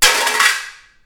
Sound effects > Objects / House appliances
License Plate roll
Metal License Plate falling and rolling on the ground.
fall, license, metal, plate, roll